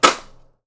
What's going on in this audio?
Sound effects > Objects / House appliances
COMTelph-Blue Snowball Microphone Nick Talk Blaster-Telephone, Slam Receiver Nicholas Judy TDC
A telephone receiver being slammed.
receiver, telephone